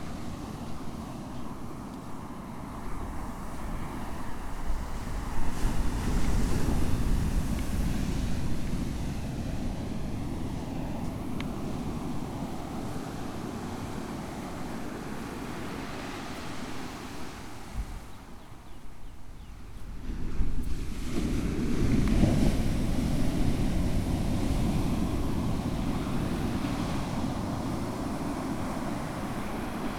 Sound effects > Natural elements and explosions
CapeCoast Ghana Waves1 NK
The immense, powerful sound of strong, crashing waves on Ghana's Cape Coast, where the Gulf Of Guinea - the northernmost part of the tropical Atlantic Ocean - meets the land. Can be used to perhaps reflect a storm at sea, or the sound of the deep ocean. Recorded on a Tascam DR-05X. 16-bit PCM.
Africa, Atlantic, Beach, Current, Field-recording, Ghana, Gulf, Natural, Nature, Ocean, Power, Sea, Storm, Water, Waves, West-Africa, Wind